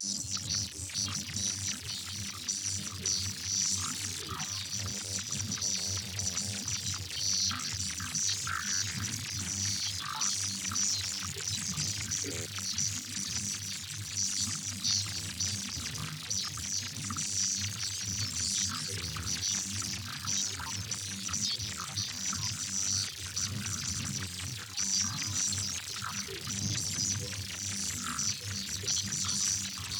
Sound effects > Electronic / Design
RGS-Random Glitch Sound 5
I was playing Fracture plugin randomly because I was boring. Synthed with Phaseplant only. Processed with Vocodex and ZL EQ